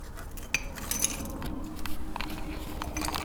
Sound effects > Other mechanisms, engines, machines
object rustle noise foley
bam, bang, boom, bop, crackle, foley, fx, knock, little, metal, oneshot, perc, percussion, pop, rustle, sfx, shop, sound, strike, thud, tink, tools, wood